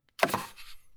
Sound effects > Other mechanisms, engines, machines
Samples of my Dewalt Chopsaw recorded in my workshop in Humboldt County California. Recorded with a Tascam D-05 and lightly noise reduced with reaper